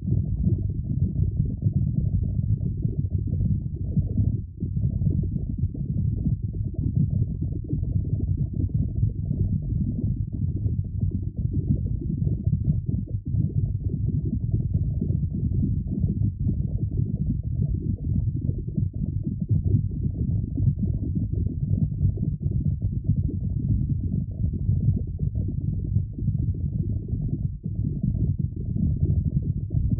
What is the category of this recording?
Soundscapes > Nature